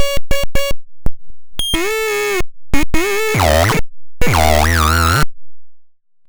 Sound effects > Electronic / Design
Optical Theremin 6 Osc dry-082
Trippy, noisey, SFX, Instrument, Dub, Theremins, Alien, Electronic, DIY, Spacey, Sci-fi, Robot, Digital, Otherworldly, Theremin, Robotic, FX, Glitch, Electro, Sweep, Experimental, Infiltrator, Synth, Handmadeelectronic, Optical, Noise, Scifi, Bass, Glitchy, Analog